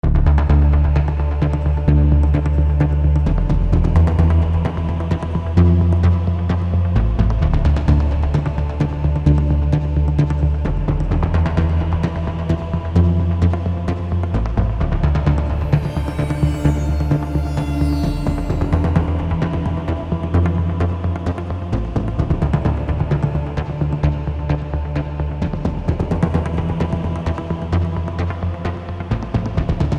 Music > Multiple instruments
Ableton Live. VST.Fury-800.......Musical Composition Free Music Slap House Dance EDM Loop Electro Clap Drums Kick Drum Snare Bass Dance Club Psytrance Drumroll Trance Sample .